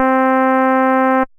Instrument samples > Synths / Electronic
03. FM-X ALL2 SKIRT5 C3root
Montage, FM-X, Yamaha, MODX